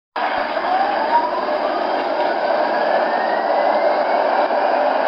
Sound effects > Vehicles
tram accelerating1
field-recording, track, tram